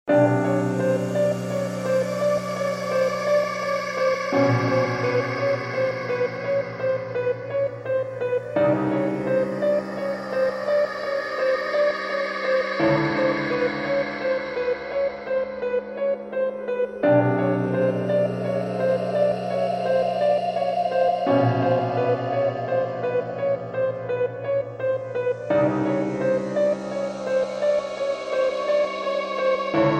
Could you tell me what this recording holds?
Music > Multiple instruments
Horror scary soundtrack
Old, Music, Ghost, Nightmare, Halloween, Track, Evil, Dark, fear, Background, Spooky, Freaky, Scary, Creepy, Horror